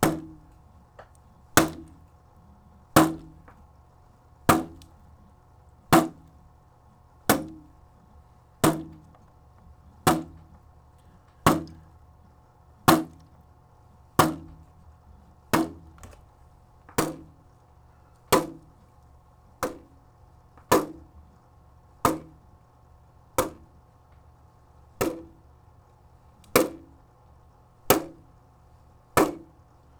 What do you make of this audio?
Sound effects > Objects / House appliances
TOONImpt-Blue Snowball Microphone, CU Hits, Full Plastic Water Bottle Nicholas Judy TDC
Full plastic water bottle hits.